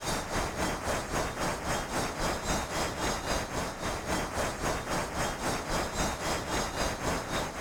Sound effects > Electronic / Design
Metallic Spin
a Normal spin Designed to feel metallic, designed with Pigments via studio One
iron, Metallic, spin